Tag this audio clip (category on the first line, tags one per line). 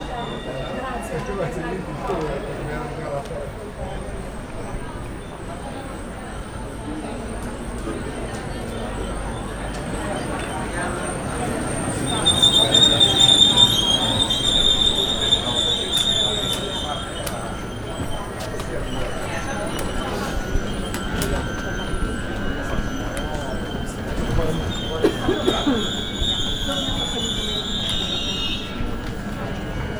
Soundscapes > Urban
break
breaks
field-recording
platform
rail
railway
railway-station
station
train
trains